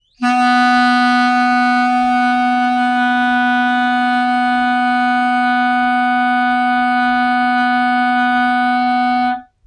Instrument samples > Wind
Recorded using microphone from phone.